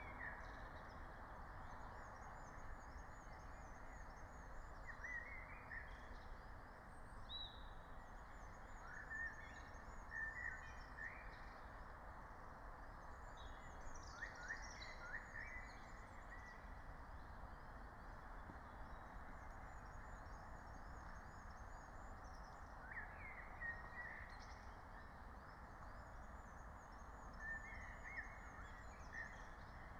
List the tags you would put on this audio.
Soundscapes > Nature
alice-holt-forest field-recording meadow nature phenological-recording raspberry-pi soundscape

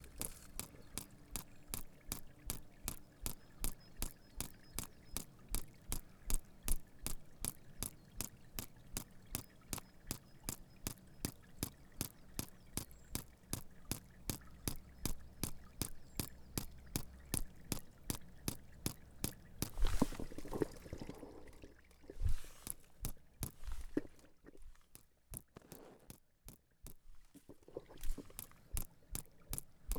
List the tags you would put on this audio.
Sound effects > Natural elements and explosions
drip dripping-water droplet Water

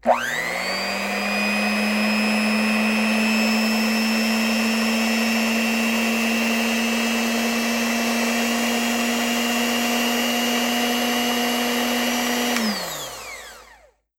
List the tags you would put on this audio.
Sound effects > Objects / House appliances
run electric-mixer Phone-recording turn-off turn-on

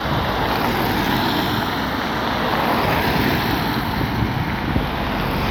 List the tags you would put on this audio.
Soundscapes > Urban
vehicle engine car

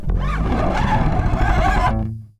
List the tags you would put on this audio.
Music > Solo instrument
bass basslines blues chords electricbass fuzz harmonic harmonics low lowend pick riffs

